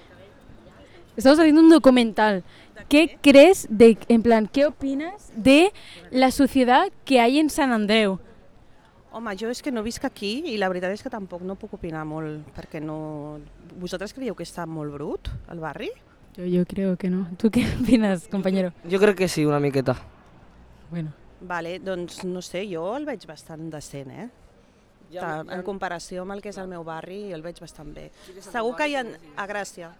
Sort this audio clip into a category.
Sound effects > Human sounds and actions